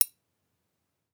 Sound effects > Other mechanisms, engines, machines
Spanner Click 05
spanner, click, sample, garage, tool